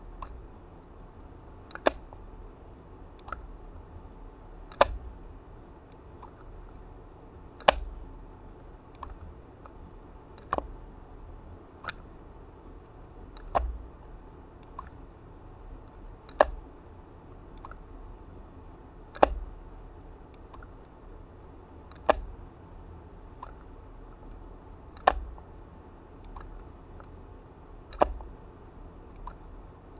Sound effects > Objects / House appliances
FOLYProp-Blue Snowball Microphone, CU Box, Wooden, Boatswain Pipes, Open, Close Nicholas Judy TDC
A wooden boatswain pipe box opening and closing.
Blue-brand, Blue-Snowball, boatswain-pipe, box, close, open, wooden